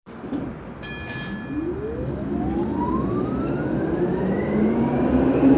Soundscapes > Urban
voice 6 17-11-2025 tram
Rattikka, Tram